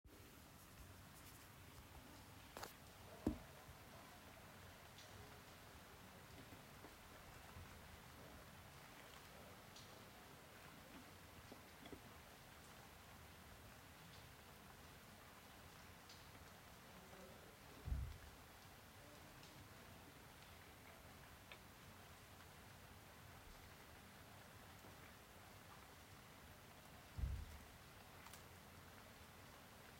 Soundscapes > Urban
Sounds from outside and inside my room (recorded on an iphone 11)
Sounds from my room. The window was open and I Was watching a video on my pc. After a while I close the window and the soundscape changes